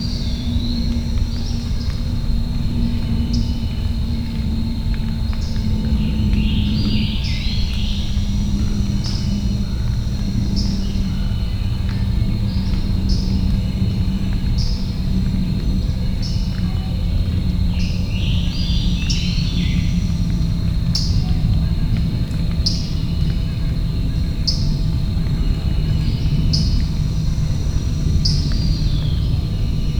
Soundscapes > Nature
AMBForst-Summer Mammoth Cave National Park, Early Morning Forest, 6AM QCF Mammoth Cave Sony M10
A Forest in Mammoth Cave National Forest, Summer, Early Morning, 6AM, birdsong, gunshot, critters